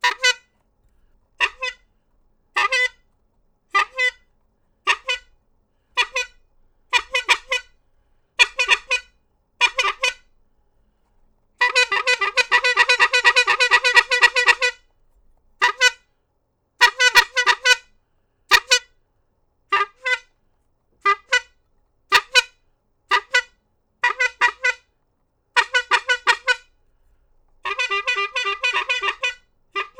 Sound effects > Objects / House appliances
TOONHorn-Blue Snowball Microphone, CU Bicycle, Bulb, Honking Nicholas Judy TDC
A bicycle bulb horn honking.
bike, horn, bulb, cartoon, Blue-brand, bicycle, Blue-Snowball, honk